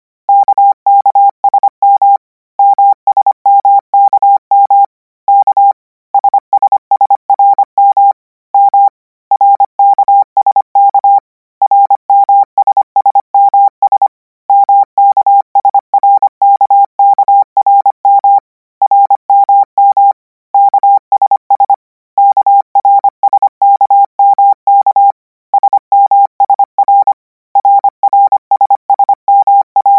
Sound effects > Electronic / Design
Koch 04 KMRS - 280 N 25WPM 800Hz 90%
Practice hear characters 'KMRS' use Koch method (after can hear charaters correct 90%, add 1 new character), 280 word random length, 25 word/minute, 800 Hz, 90% volume.